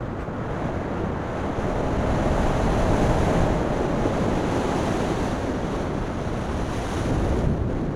Sound effects > Other
A wind sound effect I made hope it comes in handy.